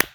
Sound effects > Human sounds and actions
Punch sound effect that is actually a hand clap.
Fight, contact